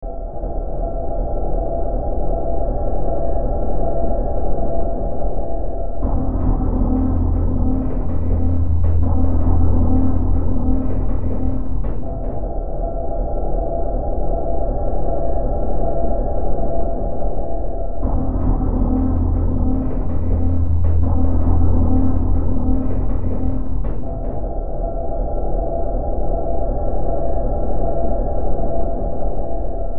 Soundscapes > Synthetic / Artificial
Looppelganger #193 | Dark Ambient Sound
Use this as background to some creepy or horror content.
Ambience, Ambient, Darkness, Gothic, Hill, Horror, Noise, Silent, Survival